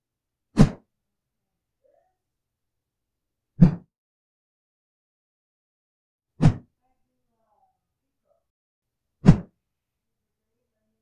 Sound effects > Objects / House appliances
stick or cane whoosh sound 09222025
foosh, air, cane, swoosh, kung-fu, combat, swipe, doley, woosh, swing, duel, weapon, melee, weapons, battle, martialarts, attack, karate, stick, whoosh, medieval, prop, swiping, sword